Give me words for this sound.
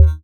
Instrument samples > Synths / Electronic
BUZZBASS 4 Eb
additive-synthesis, bass, fm-synthesis